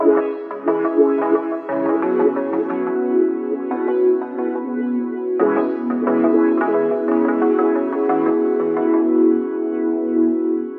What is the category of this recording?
Music > Multiple instruments